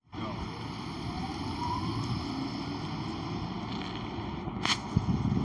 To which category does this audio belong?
Sound effects > Vehicles